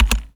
Sound effects > Objects / House appliances
FUJITSU Computers Keyboard - P key Press Mono
Key, Zoom-Brand, Keyboard, Close-up, H2N, individual-key, Zoom-H2N, key-press